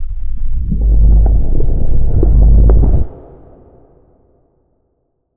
Sound effects > Natural elements and explosions
LoFi RocksGrinding Reverberated-01
Lo-Fi sound of rocks moving and scraping against each other. Stops abruptly, but reverberates. Foley emulation using wavetable synthesis.
moving, rocks, synthesis, quake, cave, lofi, scraping, earthquake, synthesizer